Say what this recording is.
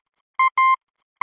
Sound effects > Electronic / Design
A series of beeps that denote the letter A in Morse code. Created using computerized beeps, a short and long one, in Adobe Audition for the purposes of free use.
Language, Morse, Telegragh